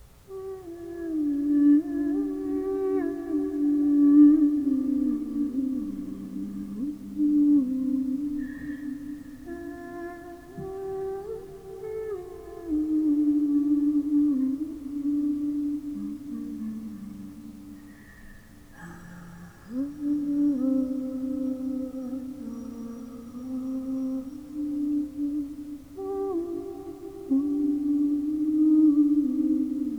Music > Solo instrument
woman humming distant echo
An edited version of a little humming track I recorded in my room, with reverb done with OrilRiver